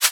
Instrument samples > Synths / Electronic
A shaker one-shot made in Surge XT, using FM synthesis.
fm, electronic, synthetic